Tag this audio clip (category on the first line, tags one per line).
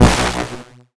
Instrument samples > Synths / Electronic
bass
additive-synthesis
fm-synthesis